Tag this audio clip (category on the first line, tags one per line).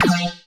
Sound effects > Electronic / Design
alert
button
digital
interface
menu
notification
options
UI